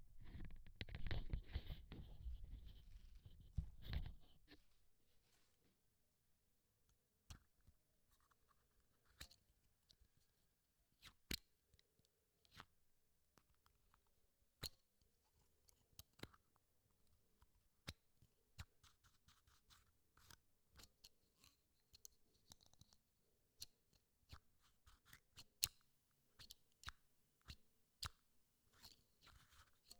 Sound effects > Other mechanisms, engines, machines
oven button press
Oven buttons being pushed
oven, plastic, button, mechanical